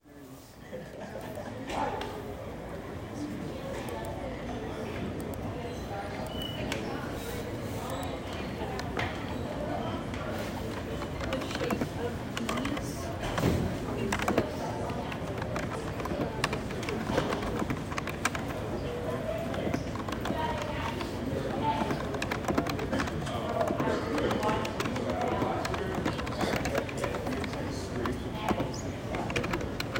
Sound effects > Electronic / Design
I tried to make this sound like a computer lab room. I tried to do this by recording the main sound of a computer lab without any people in the room, recording the sound of typing and clicking, and recording people talking somewhere else other than the lab so that I didn’t record everything in one room. It was a little hard to try to record just the sound of the classroom without anyone in it. I really just put my phone on a table or desk and recorded from there.